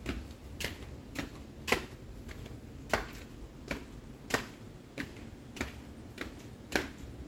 Sound effects > Human sounds and actions
FEETHmn-Samsung Galaxy Smartphone, MCU Footsteps On Concrete Nicholas Judy TDC
Footsteps on concrete.
concrete, foley, footsteps, Phone-recording